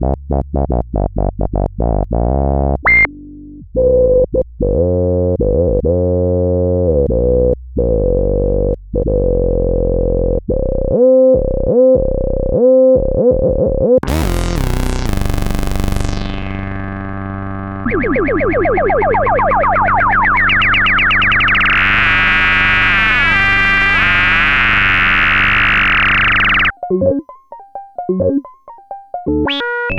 Instrument samples > Synths / Electronic
Make Noise Moogseum Reel
Recordings of the vintage Moog Modular at the Moogseum in Asheville NC, starting with raw recordings and then moving on to sounds processed through the Make Noise ReSynthesizer. Formatted for use in the Make Noise Soundhack Morphagene.